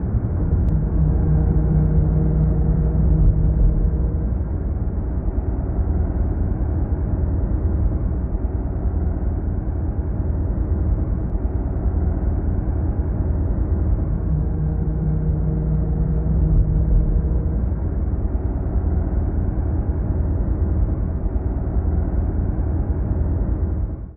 Sound effects > Other mechanisms, engines, machines
Engine sound i made from buss engine sound. Can be used for whatever you´d like lol.
drive, engine, car, vehicle, start, motor